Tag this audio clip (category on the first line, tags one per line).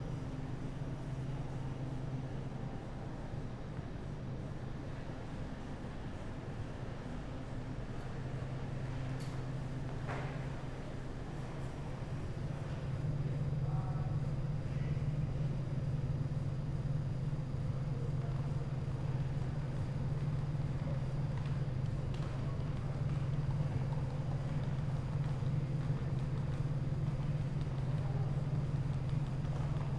Soundscapes > Indoors
wallah
ambience
DC
station
people
train